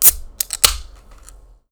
Sound effects > Objects / House appliances
OBJCont-Blue Snowball Microphone, CU Soda Can, Open Nicholas Judy TDC
A soda can opening.
can, Blue-Snowball, Blue-brand, foley, open, soda